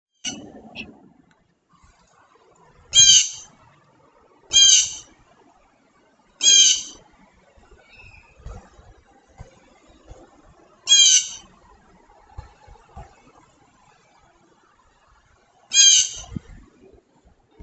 Sound effects > Animals
This is the sound of the blue jay that was in the tree near me, while I sat on a park bench.